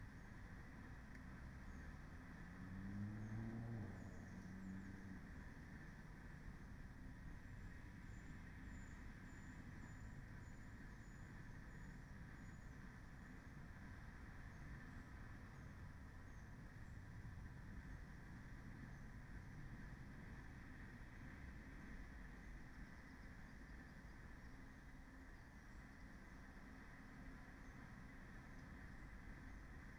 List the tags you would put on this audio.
Soundscapes > Nature
data-to-sound
modified-soundscape
natural-soundscape
artistic-intervention
phenological-recording
nature
sound-installation
field-recording
soundscape
alice-holt-forest
Dendrophone
raspberry-pi
weather-data

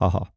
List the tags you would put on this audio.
Speech > Solo speech
2025
Adult
Calm
FR-AV2
haha
Hypercardioid
july
Male
mid-20s
MKE-600
MKE600
sarcastic
Sennheiser
Shotgun-mic
Shotgun-microphone
Single-mic-mono
Tascam
VA
Voice-acting

